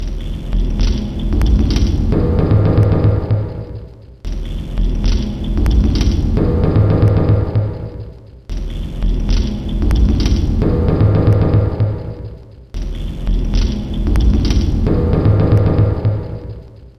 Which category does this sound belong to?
Instrument samples > Percussion